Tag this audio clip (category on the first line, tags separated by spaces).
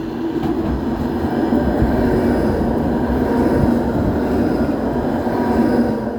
Sound effects > Vehicles
Tampere moderate-speed tram passing-by embedded-track